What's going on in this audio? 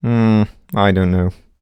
Speech > Solo speech
Doubt - Hmm I dont know
dialogue, voice, Voice-acting, U67, Male, singletake, Single-take, Vocal, NPC, FR-AV2, Video-game, I-Dont-know, talk, Human, Tascam, skeptic, doubt, Mid-20s, oneshot, Neumann, Man, skepticism